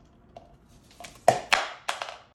Sound effects > Objects / House appliances
pop top
Me opening the top of something
food, lid, container, jar, bottle, top